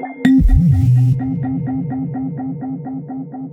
Instrument samples > Synths / Electronic
CVLT BASS 8
stabs, subwoofer, clear, synthbass, drops, bass, bassdrop, lfo, lowend, low, subs, subbass, wavetable, sub, wobble, synth